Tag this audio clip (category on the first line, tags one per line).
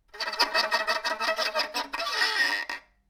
Instrument samples > String
horror
bow